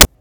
Sound effects > Experimental
noise hit
sounds like a crappy bass drum or smthg
noise, hit